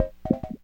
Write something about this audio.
Sound effects > Experimental
Analog Bass, Sweeps, and FX-205
dark, fx, alien, electro, sweep, scifi, sci-fi, analogue, retro, analog, snythesizer, mechanical, weird, vintage, effect, complex, trippy, machine, korg, sfx, pad, electronic, sample, synth, robotic, basses, bass, oneshot, bassy, robot